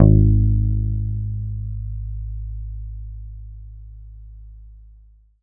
Instrument samples > String
Gs1 rr1
bass, guitar, instrument, picked, real, riff